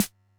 Instrument samples > Percussion
Sampleando mi casiotone mt60 con sus sonidos de percusión por separado Sampling my casiotone mt60 percusion set by direct line, sparated sounds!